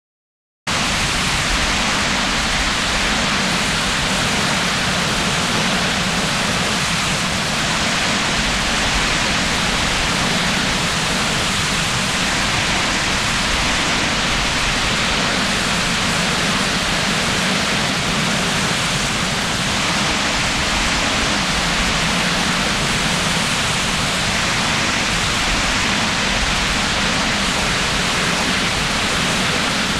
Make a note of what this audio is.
Sound effects > Electronic / Design
FX Static Tape Amb AcidReighn
Atmospheric ambience, VHS hiss and eerie resonance — acid-toned.
abstract, ambience, creepy, dark, electronic, hiss, processed, sci-fi, tape, weird